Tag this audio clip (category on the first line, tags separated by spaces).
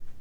Sound effects > Objects / House appliances
carton clack click foley industrial plastic